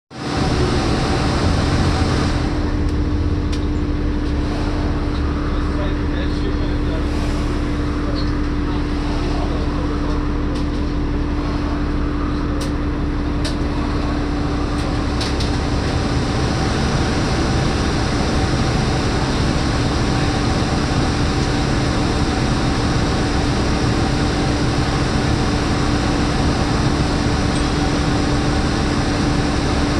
Sound effects > Vehicles
2005 New Flyer D40LF Loud Engine Fan (MiWay 0518)
I recorded the engine and transmission sounds when riding the Mississauga Transit/MiWay buses. This is a recording of a 2005 New Flyer D40LF transit bus, equipped with a Cummins ISL I6 diesel engine and Voith D864.3E 4-speed automatic transmission. This bus was retired from service in 2023. This specific bus has a fairly loud fan noise from either the engine fan or the air conditioner, heard in the video.